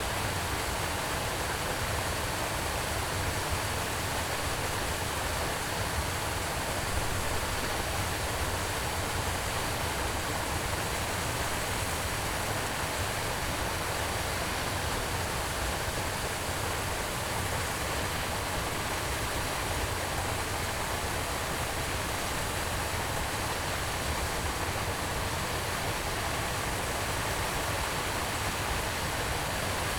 Soundscapes > Nature
Waterfall, distance, thunderous bass
The Loup of Fintry from a distance. Very nice low end in this recording. Recorded in ORTF using Line Audio CM4's.
field-recording, river, stream, water, waterfall